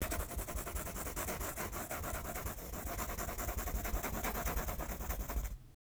Sound effects > Objects / House appliances
Pencil scribbles/draws/writes/strokes at unpredictably speeds.